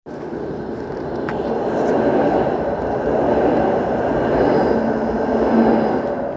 Soundscapes > Urban
voice 18-11-2025 20-1 tram
Rattikka, Tram, TramInTampere